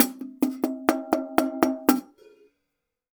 Music > Solo instrument
Vintage Custom 14 inch Hi Hat-021
Oneshot, Percussion, Drum, Hats, Vintage, Metal, Cymbals, Hat, Drums, Perc, Custom, Kit, Cymbal, HiHat